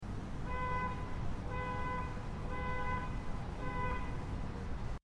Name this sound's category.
Sound effects > Vehicles